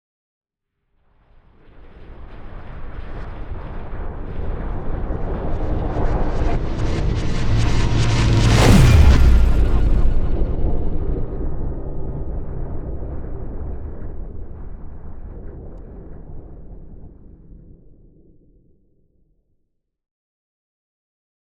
Sound effects > Other
Sound Design Elements SFX PS 065
Effects recorded from the field.